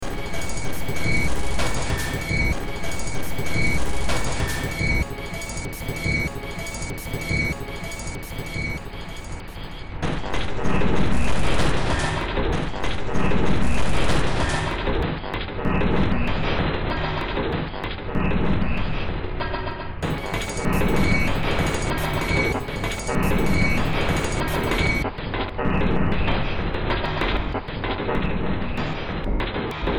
Music > Multiple instruments
Short Track #3341 (Industraumatic)
Underground, Soundtrack, Cyberpunk, Industrial, Horror, Games, Ambient, Sci-fi